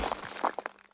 Sound effects > Human sounds and actions
Single step in wet gravel and mud
Footsteps on wet gravel and mud, recorded in the park.
pebbles, rain, splash, step, walk